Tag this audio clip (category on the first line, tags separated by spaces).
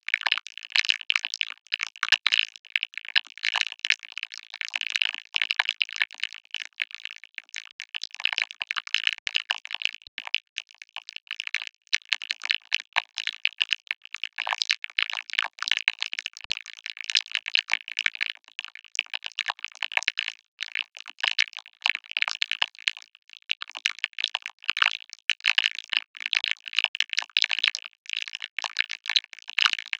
Sound effects > Electronic / Design
Botanical FX Gooey Hypha Mushroom mycelium rumble Spore Texture